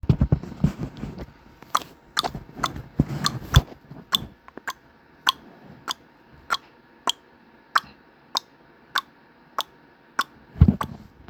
Sound effects > Human sounds and actions
Just clicking my tongue away.